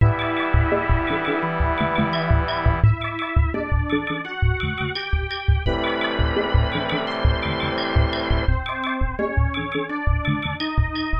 Music > Multiple instruments
Chill loop
A short loop made using beepbox. Use this for a lobby or shop in a video game, or any time that you need a chill and relaxed chill chill music chill piece chill. I can't tell whether this sounds good or not because I used this for a game once that I had to test over and over again so this song now feels really annoying and drives me insane